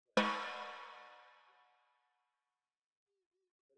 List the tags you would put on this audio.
Music > Solo percussion
acoustic beat brass crack drum drumkit flam fx hit hits kit processed realdrum reverb rimshot rimshots sfx snare snaredrum snareroll snares

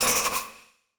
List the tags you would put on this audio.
Sound effects > Other
arrow game interface magic projectile shot spell ui

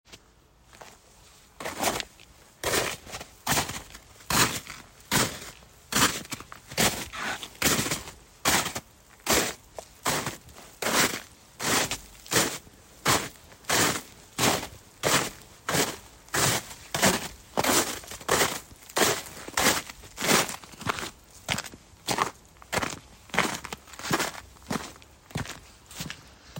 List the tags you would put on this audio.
Soundscapes > Nature
cold freeze snow winter

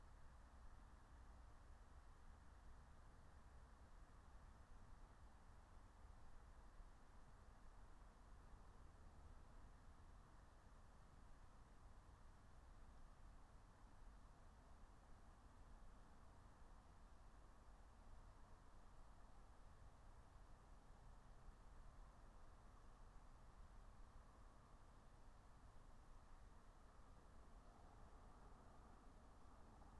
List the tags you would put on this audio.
Soundscapes > Nature
nature natural-soundscape alice-holt-forest phenological-recording raspberry-pi meadow field-recording soundscape

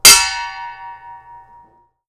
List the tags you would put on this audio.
Sound effects > Objects / House appliances
clang impact metal Blue-brand Blue-Snowball

